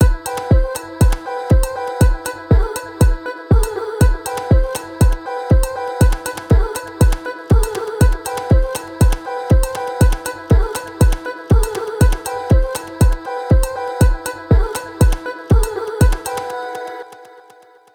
Music > Solo percussion
Vocal based drum loop 120bpm
drum-loop, cymatics, vocal, 120bpm, loop, 120-bpm